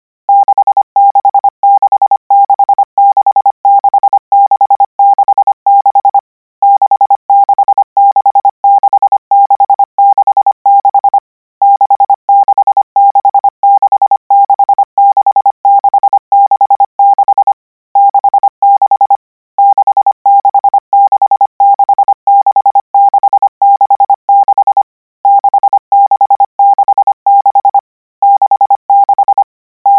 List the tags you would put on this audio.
Sound effects > Electronic / Design
code radio morse numbers numeros codigo

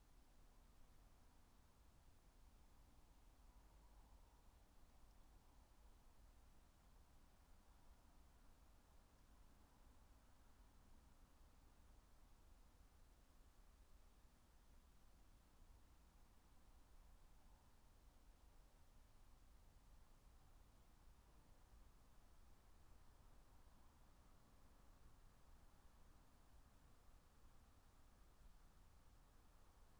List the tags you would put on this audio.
Soundscapes > Nature
raspberry-pi nature Dendrophone alice-holt-forest data-to-sound phenological-recording sound-installation field-recording natural-soundscape artistic-intervention soundscape weather-data modified-soundscape

